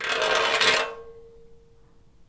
Sound effects > Other mechanisms, engines, machines
Handsaw Tooth Teeth Metal Foley 4
foley, fx, handsaw, hit, household, metal, metallic, perc, percussion, plank, saw, sfx, shop, smack, tool, twang, twangy, vibe, vibration